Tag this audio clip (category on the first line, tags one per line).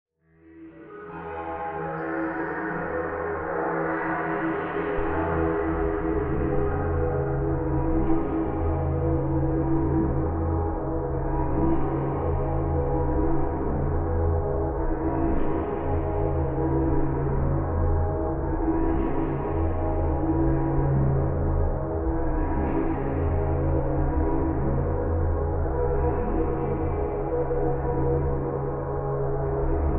Soundscapes > Synthetic / Artificial
fx
drone
effect
wind
synthetic
ambience
rumble
roar
long
shimmer
howl
sfx
shimmering
slow
glitch
bass
evolving
shifting
low
landscape
dark
glitchy
experimental
atmosphere
ambient
bassy
texture
alien